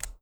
Sound effects > Objects / House appliances
OBJWrite-Blue Snowball Microphone Pen, Top, Open Nicholas Judy TDC
A pen opening it's top.